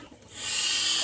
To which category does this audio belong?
Sound effects > Animals